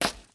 Sound effects > Other
Quick vegetable chop 5
Potato being quickly chopped with a Santoku knife in a small kitchen.
Cook, Home, Chef, Vegetable, Chop, Chief, Quick, Slice, Knife, Cooking, Kitchen